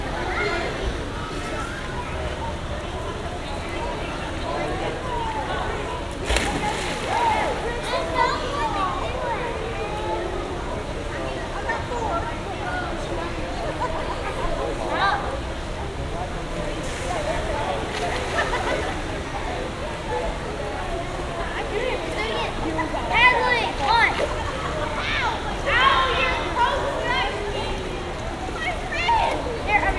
Soundscapes > Urban
Kids playing in a pool at a beachside condo in Southern Alabama. Summer, midday. Splashing